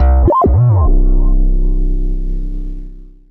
Instrument samples > Synths / Electronic
CVLT BASS 32
clear, sub, wobble, lowend, bass, synthbass, stabs, low, subbass, wavetable, drops, synth, subs, lfo, bassdrop, subwoofer